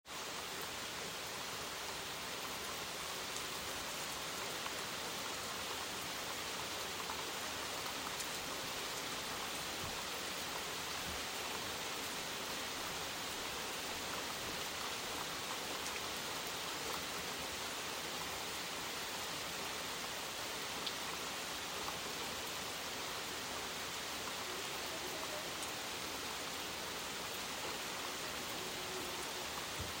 Soundscapes > Nature
woodlands downpour Ekelund part 2
Summer downpour on the wood terrace at log-cabin deep in the forest just outside Ekelund Sweden. Original field-recording.
afternoon, backwoods, downpour, field-recording, forest, jungle, log-cabin, nature, rain, rainstorm, Scandinavia, spring, summer, Sweden, thunder, torrent, wilderness, woodlands